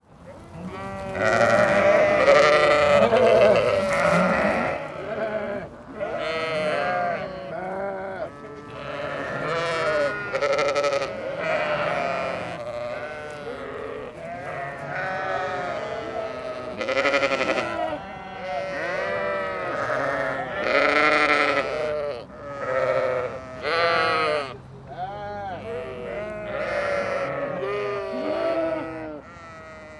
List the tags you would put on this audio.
Sound effects > Animals
farm sheep

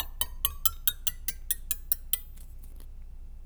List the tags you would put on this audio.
Sound effects > Objects / House appliances
Clang Wobble Metal Perc

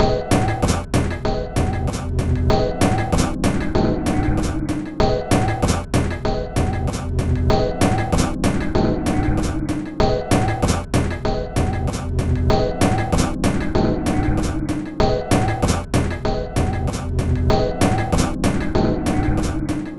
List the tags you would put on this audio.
Instrument samples > Percussion
Drum Industrial Packs Underground Loop Dark Soundtrack Weird Samples

